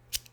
Sound effects > Other
LIGHTER.FLICK.5
Lighter, zippo